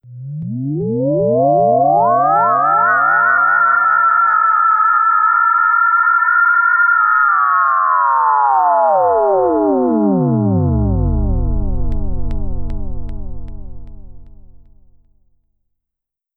Sound effects > Electronic / Design
SCIRetro Synth Slide Up, Down, 50's Space Nicholas Judy TDC
A 1950's synthesized spacey slide up and down. Created using Femur Design's Theremin app.
1950s, anime, cartoon, down, outer-space, sci-fi, slide, space, synthesized, up